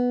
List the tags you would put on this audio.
Instrument samples > String
guitar
stratocaster

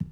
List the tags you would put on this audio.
Sound effects > Objects / House appliances
cleaning
shake
metal
handle
pail
object
tool
carry
kitchen
clatter
drop
bucket
pour
debris
container
foley
tip
household
plastic
spill
water
scoop
knock
fill
clang
lid
garden
liquid
hollow
slam

